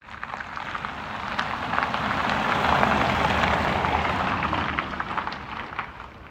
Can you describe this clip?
Sound effects > Vehicles
electric vehicle driving by
ev, electricvehicle, driving